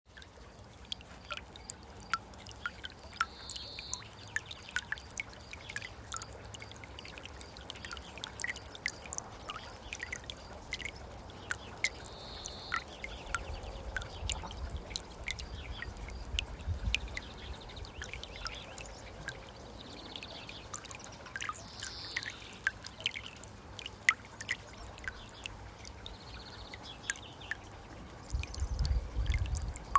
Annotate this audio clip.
Soundscapes > Synthetic / Artificial
Fountain water
Date and Time: 17/05/2025 9h20 am Venue: Moreira do Lima, Ponte do Lima Sound type: Signal- usually keynote sound but deliberately emphasized Type of microphone used: Iphone 14 omnidirectional internal microphone (Dicafone was the application used) Distance from sound sources: 50cm